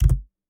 Sound effects > Objects / House appliances
Pressing keys on a laptop keyboard, recorded with an AKG C414 XLII microphone.